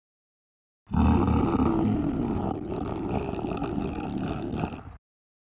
Sound effects > Human sounds and actions
Stomach rumbling loudly
This wasn’t my real stomach but it’s great for cartoons, videos, and more
Stomach, rumble, Im, grumble, growing